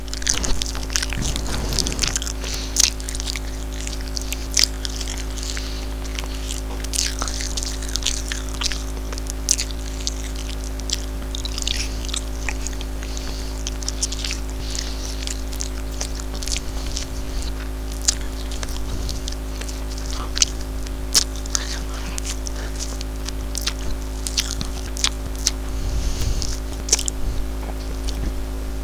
Sound effects > Animals
Created by Audacity. Enjoy!